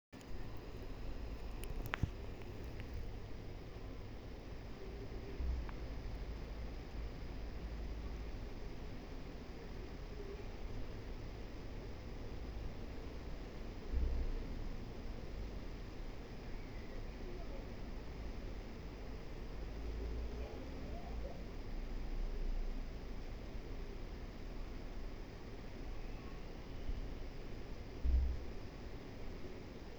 Sound effects > Other mechanisms, engines, machines
20250515 1633 laptop noise phone microphone
atmophere, recording, field